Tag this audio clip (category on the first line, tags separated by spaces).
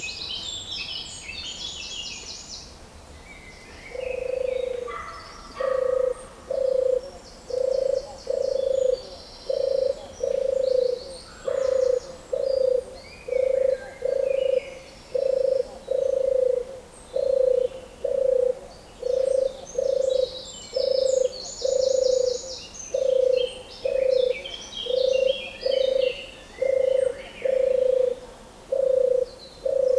Soundscapes > Nature
birds birdsong field-recording forest nature spring turtle-doves